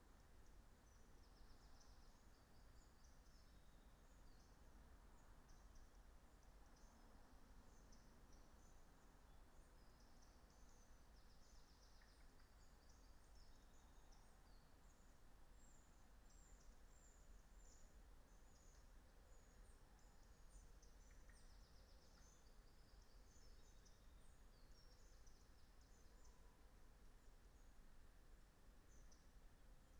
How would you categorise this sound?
Soundscapes > Nature